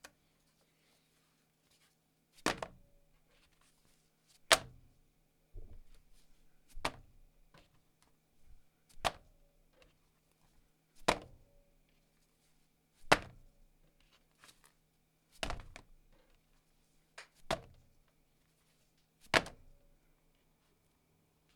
Sound effects > Human sounds and actions
A hat or a piece of fabric tossed on an empty table top.